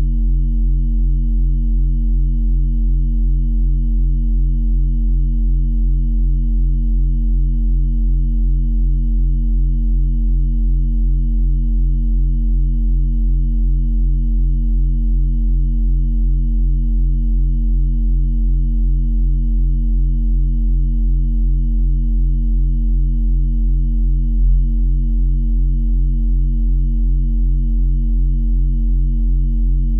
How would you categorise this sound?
Soundscapes > Synthetic / Artificial